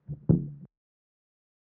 Sound effects > Experimental
Plastic Fermentation Container 2 EQ
Bonk sound recording
techno, deep, recorded, sample